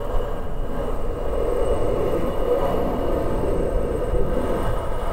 Sound effects > Objects / House appliances
Dragging a cup on the table
Dragging the coffee cup on the wood table
cup, dragging, table